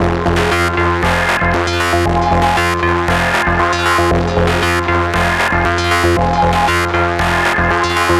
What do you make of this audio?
Music > Solo instrument
117 D# Polivoks Brute 10
Melodic loops made with Polivoks and Casio SK1 analogue synths
Loop, Vintage